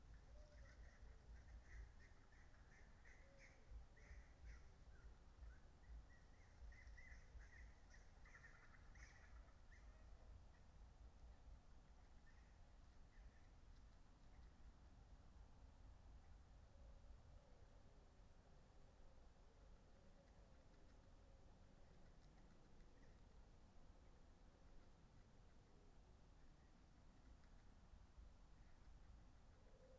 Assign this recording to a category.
Soundscapes > Nature